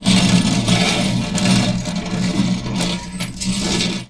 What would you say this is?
Sound effects > Objects / House appliances
Metal stool rolling on the ground.
squeaky, rolling, stool